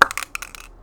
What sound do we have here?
Sound effects > Objects / House appliances
OBJCont-Blue Snowball Microphone, Cu Spray Can, Pop Open, Shake Nicholas Judy TDC
A spray can popping open with a shake.
Blue-brand, spray-can, shake, pop, Blue-Snowball, open, lid, foley